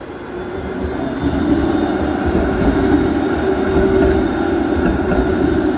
Sound effects > Vehicles
tram sunny 10
A recording of a tram passing by on Insinöörinkatu 41 in the Hervanta area of Tampere. It was collected on November 12th in the afternoon using iPhone 11. The weather was sunny and the ground was dry. The sound includes the whine of the electric motors and the rolling of wheels on the tracks.
tram, motor, sunny